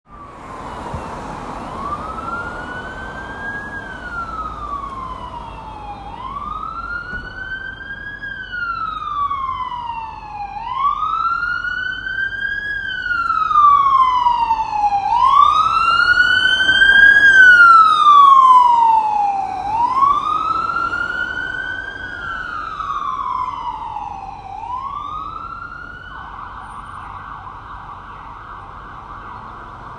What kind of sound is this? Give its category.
Sound effects > Vehicles